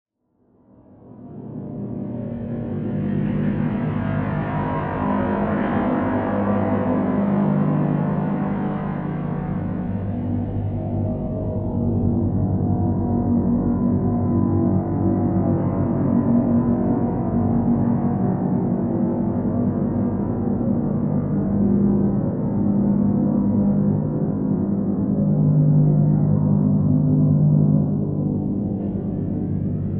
Sound effects > Experimental
Audio recording of a fallen tree getting chopped up by chainsaws and being fed into a wood chipper. The audio was then HEAVILY processed (flange, echo, reverb & slow speed) in AVS Audio Editor to get a sci-fi sound. Recorded with a Zoom H6 Essential recorder.